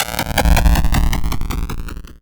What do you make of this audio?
Sound effects > Electronic / Design
SFX Opening Path2
Made using SFXR, this sounds like when you can finally proceed to another area.
artificial, digital, sfx, soundeffect, unlock